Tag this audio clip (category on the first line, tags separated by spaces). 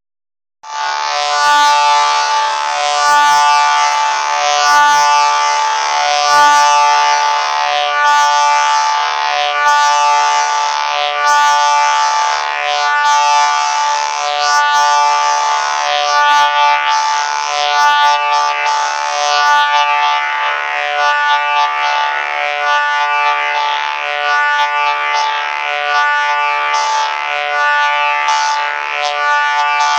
Sound effects > Other mechanisms, engines, machines
gigantic dreamlike telephone insekt phone synth technica converters